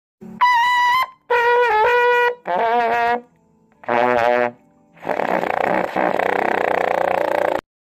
Instrument samples > Wind
Patrick - 5octaves mellophoneBb 2025-04-15 22 58
Mellophone Bb 5 octaves last one is a dirty pedal tone